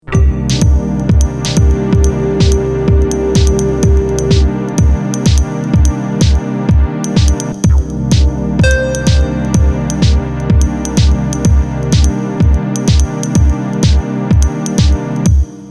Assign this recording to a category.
Music > Multiple instruments